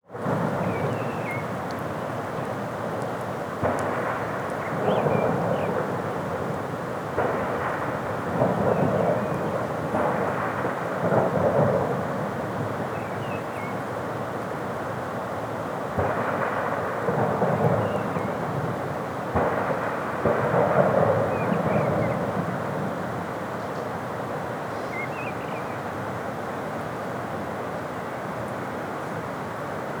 Soundscapes > Nature
Switzerland Kandersteg booms birds
Recording of nearby birds and mysterious distant boom sounds high above Kandersteg near Oeschinensee. Recorded April 26 2025 with Clippy EM272s.